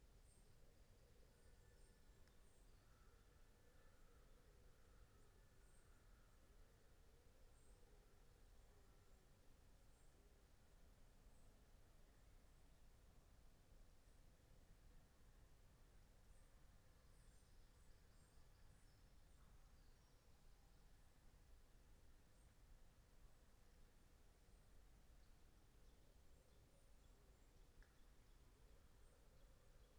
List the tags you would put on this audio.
Soundscapes > Nature
Dendrophone sound-installation modified-soundscape data-to-sound phenological-recording natural-soundscape raspberry-pi soundscape field-recording artistic-intervention alice-holt-forest weather-data nature

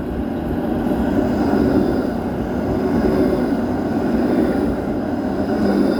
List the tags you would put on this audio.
Sound effects > Vehicles
embedded-track moderate-speed tram

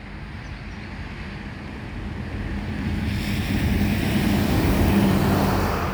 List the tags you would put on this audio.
Sound effects > Vehicles
engine vehicle bus

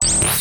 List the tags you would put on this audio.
Sound effects > Electronic / Design
digital menu options

